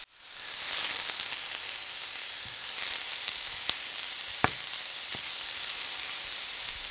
Sound effects > Objects / House appliances
Sizzling food 04
Kitchen cooking sound recorded in stereo.